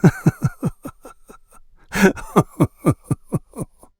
Sound effects > Human sounds and actions
Man- Smug laugh 3
A man's smug, obnoxious laugh.
British, chuckles, evil, human, laugh, laughter, male, man, smug, vocal, voice